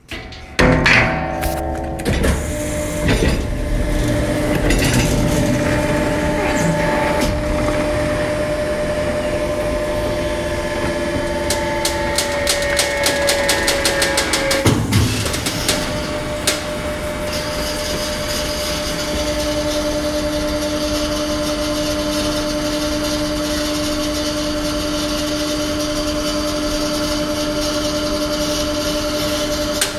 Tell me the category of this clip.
Sound effects > Other mechanisms, engines, machines